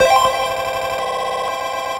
Sound effects > Electronic / Design
A manipulated gamer glitch.
8-bit, atari, chip, chipsound, chiptune, gameboy, glitch, retro, sounddesign